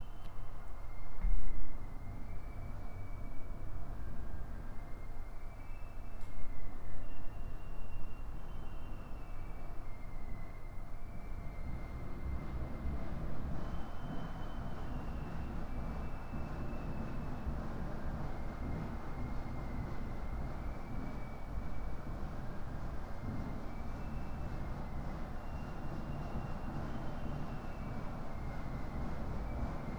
Soundscapes > Indoors
The haunting sounds of an Orange Walk flute band from inside a flat, sirens, wind through window
They're always on the bloody wind up that lot. DR-05x